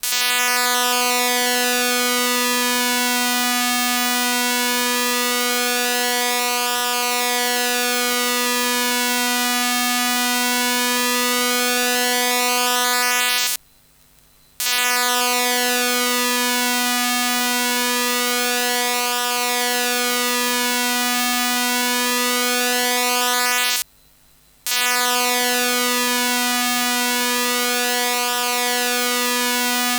Sound effects > Objects / House appliances
Christmas Tree LED Electromagnetic Field Recording #004
Electromagnetic field recording of a Christmas Tree LED (Light Emitting Diode). The pickup coil is placed on top of the LED and it's capturing part of the sequence where LEDs are gradually changing intensity and color. Electromagnetic Field Capture: Electrovision Telephone Pickup Coil AR71814 Audio Recorder: Zoom H1essential